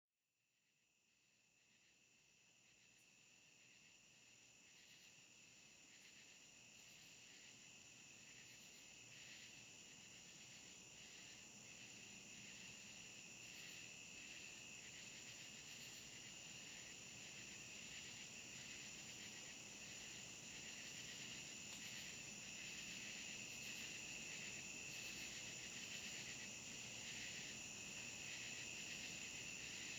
Soundscapes > Nature
Near 90 Minute Loop of Katydids and Crickets (no chimes)
I attempted to do another "condo deck recording" of nighttime Katydid and Crickets sounds. This time the windchimes were put away. However, this happened to be a busy night air travel wise. The sounds of commercial jets was almost non stop! What to do? I took a "clean sounding" 6 minute clip and I made a long loop of the insect sounds. Good for chilling or falling asleep to. Recorded with a Zoom H6 Essential. Edited in AVS Audio Editor.
night, nighttime, summer